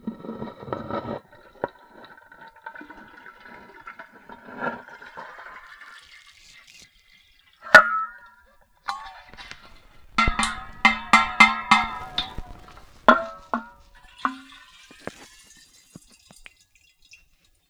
Sound effects > Experimental
contact mic in metal thermos, emptying4
Water being poured out of a thermos recorded with a contact microphone. Somewhat quiet.
contact-mic, contact-microphone, experimental, thermos, water, water-bottle